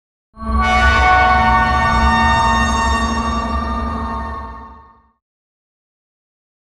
Sound effects > Other
Dark textures SFX 023
All samples used in the production of this sound effect are recorded by me. I used ASM Hydrasynth Deluxe to design this effect, post-production was done in REAPER DAW.
granular, distorted, gloomy, drone, mysterious, pad, ambient, ominous, creepy, soundscape, horror, sound, dark, eerie, atmospheric, sfx, unsettling, abstract, evolving, effects, textures